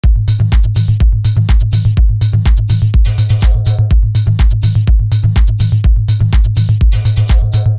Instrument samples > Percussion
Ableton Live. VST.Serum......Drum and Bass Free Music Slap House Dance EDM Loop Electro Clap Drums Kick Drum Snare Bass Dance Club Psytrance Drumroll Trance Sample .
Dance, Drum, Electro, Kick, Music, Drums, Loop, Clap, EDM, Snare, Free, Slap, Bass, House